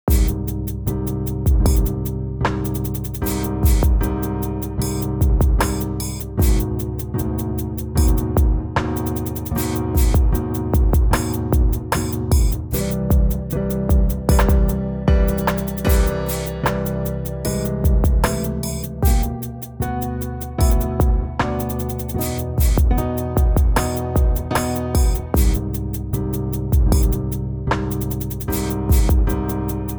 Music > Multiple instruments
Brood Loom Piano Melody with Trip Hop Beat 152bpm
A chill beat and melody I recorded in my studio using fl studio and reaper
keyloop, chill, piano, loop, ki, hiphop, dark, kitloop, ambient, melodyloop, melody, beat, thoughtful, loopable, drumloop, triphop, keys, trip